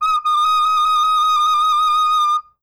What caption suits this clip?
Music > Solo instrument
MUSCWind-Blue Snowball Microphone Recorder, Ta Da! Nicholas Judy TDC
A recorder - 'ta-da!'.
Blue-brand, Blue-Snowball, cartoon, recorder, ta-da